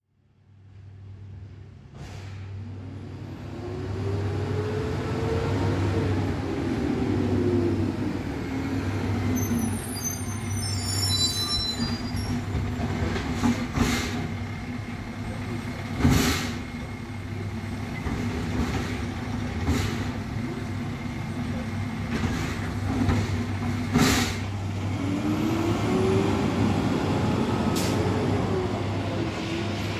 Sound effects > Vehicles
VEHTruck-Samsung Galaxy Smartphone, CU Garbage, Approach, Picking Up Trash, Pull Away Nicholas Judy TDC
A garbage truck approaching, dispensing trash out of a trash can into the truck and pulling away.
drive, garbage-truck, Phone-recording, out, dispense, away, pull-away, can